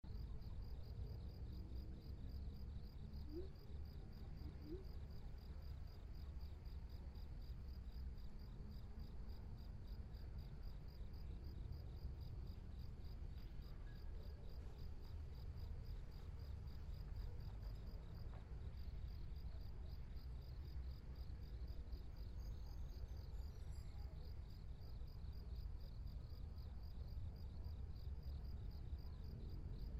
Soundscapes > Nature
Ambiental Crickets
Este sonido fue grabado en los suburbios de Dallas, Texas, aproximadamente a las 9:21 p.m.
ambient, night, crickets